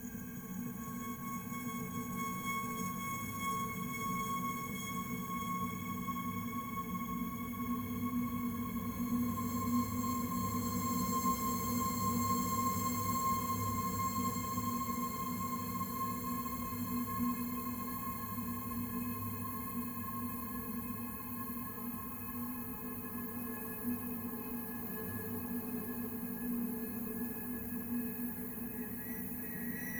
Soundscapes > Synthetic / Artificial
Horror Atmosphere 9 Forbidden Fruit Loop
Horror Atmosphere Ambience - created by layering various field recordings and foley sounds and applying processing and effects. A readymade loop that can be extended to any length required, mixed with voiceover in mind.
Dark-Ambience, Dark-Atmosphere, Deal-with-the-Devil, Horror, Horror-Atmosphere, Seamless-loop, Temptation